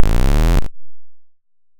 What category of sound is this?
Sound effects > Electronic / Design